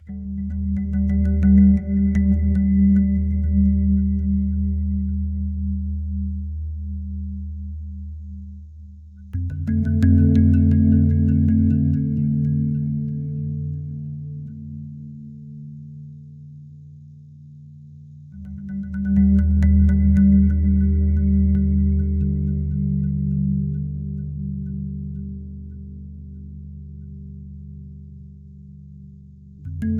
Music > Multiple instruments
This is part of my experiments to generate excerpts and melodies which can connect soul and earth by using AI. AI: Suno Prompt: soil, organic, tribal, atonal, a-tonal, non-melodic, meditative, ambient, calm, low tones, reverb, delay, background